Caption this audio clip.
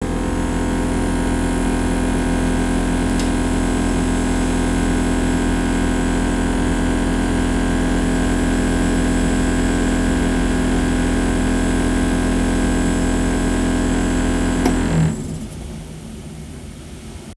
Sound effects > Objects / House appliances
ELECBuzz refrigerator buzz constant turns off vibrating noise ECG FCS2
The refrigerator cooling makes a peculiar vibrating noise
buzz, constant, off, refrigerator, turns, vibrating